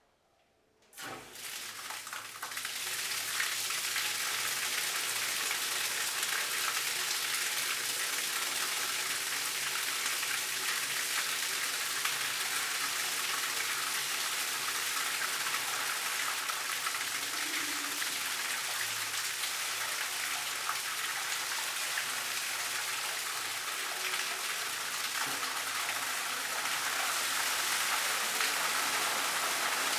Soundscapes > Indoors
Filling a tub at Apollo Hydrotherapy Municipal Baths in Ikaria, Greece. Recorded using a Zoom H2essential recorder.